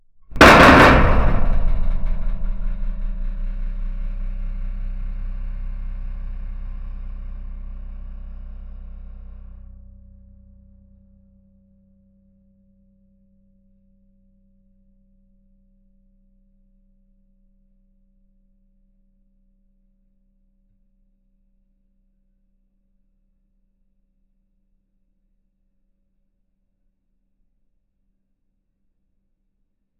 Sound effects > Other
shopping cart. recorded with a zoom F3, homemade piezo pickup, and a diy piezo preamp kit